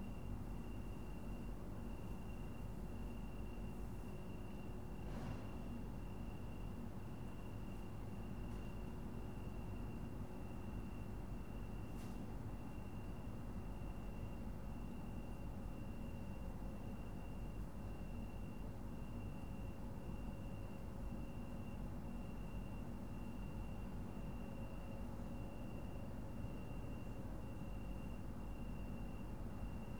Soundscapes > Urban
Summer Night Ambience – Heat, AC Units, Sparse Traffic
A warm Georgian summer night soundscape with humming air conditioners, distant courtyard noise, and occasional passing cars. Calm, atmospheric, slightly urban. If you’d like to support my work, you can get all my ambience recordings in one pack on a pay-what-you-want basis (starting from just $1). Your support helps me continue creating both free and commercial sound libraries! 🔹 What’s included?
noise, warm, Tbilisi, summer, courtyard, AC, heat, Georgia, city, field-recording, cars, night, traffic, hum